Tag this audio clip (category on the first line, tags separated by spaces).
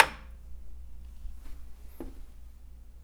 Sound effects > Objects / House appliances
drill sfx object fieldrecording bonk mechanical metal oneshot industrial clunk glass percussion natural stab foundobject foley fx hit perc